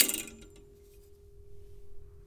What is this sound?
Sound effects > Other mechanisms, engines, machines
Woodshop Foley-004
bam, bang, boom, bop, crackle, foley, fx, knock, little, metal, oneshot, perc, percussion, pop, rustle, sfx, shop, sound, strike, thud, tink, tools, wood